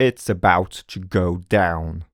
Speech > Solo speech
Its about to go down
go, raw, Single-take, Vocal